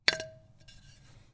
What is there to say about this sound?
Music > Solo instrument
Marimba Loose Keys Notes Tones and Vibrations 32-001
block, foley, fx, keys, loose, marimba, notes, oneshotes, perc, percussion, rustle, thud, tink, wood, woodblock